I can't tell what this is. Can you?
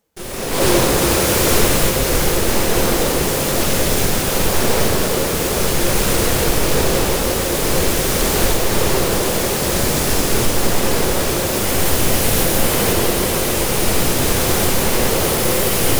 Soundscapes > Synthetic / Artificial
Grain Space 8
electronic, experimental, free, glitch, granulator, noise, packs, sample, samples, sfx, sound-effects, soundscapes